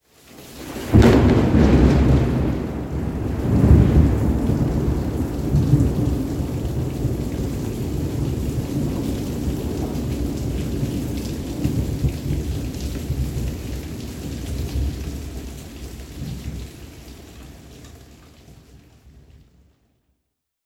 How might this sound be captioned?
Sound effects > Natural elements and explosions
THUN-Samsung Galaxy Smartphone, CU Loud Boom, Rolls, Slight Crash, Heavy Rain Nicholas Judy TDC
A loud thunder boom and rolls with slight crash and heavy rain.